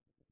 Music > Solo percussion
Snare Processed - Oneshot 86 - 14 by 6.5 inch Brass Ludwig
acoustic, beat, drum, drums, flam, hit, hits, ludwig, perc, percussion, realdrum, reverb, rim, rimshot, roll, snare, snaredrum, snareroll